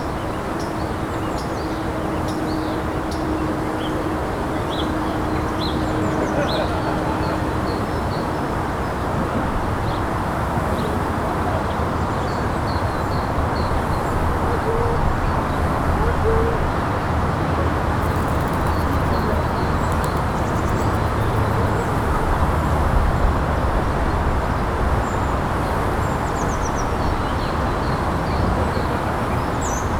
Soundscapes > Nature
2025 04 11 12H14 Le soler lac XY
Subject : Next to the lake in "Le soler". Date YMD : 2025 04 11 08h28 Location : Le soler France. Hardware : Zoom H2n XY Weather : Processing : Trimmed and Normalized in Audacity. Notes : Facing west.